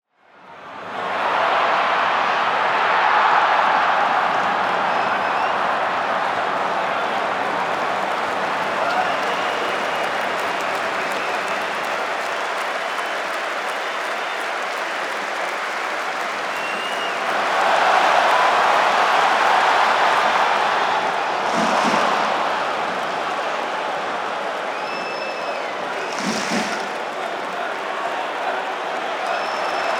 Soundscapes > Other
CRWDReac-SOCCER Millerntor Stadium Crowd Reaction General Ambience 01 PHILIPP FEIT FCSP 29.546 Sound Of Sankt Pauli
Authentic live ambience from FC St. Pauli’s Millerntor Stadium, capturing the vibrant atmosphere of 29,546 enthusiastic fans.
Reaction, Crowd, Atmosphere, Millerntor, Football, Stadium, General, Ambience, SanktPauli, Soccer